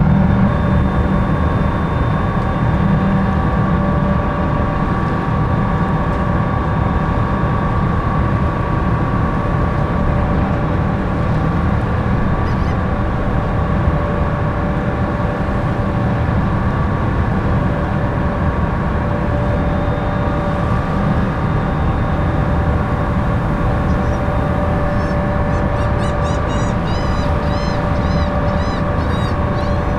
Soundscapes > Urban
AMBNaut-Summer Fort Morgan Ferry near edge, water, birds, afternoon QCF Gulf Shores Alabama Zoom H1n
near the starboard side of the Ferry between Dauphin Island and Fort Morgan, Alabama. Summer late afternoon, engines, passengers, seagulls, wind, waves.
engines, ferry, field-recording, seagulls, water, wind